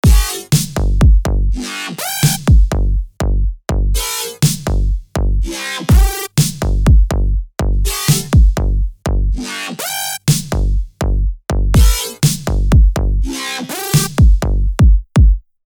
Music > Multiple instruments
Ableton Live. VST.Massive.....Musical Composition Free Music Slap House Dance EDM Loop Electro Clap Drums Kick Drum Snare Bass Dance Club Psytrance Drumroll Trance Sample .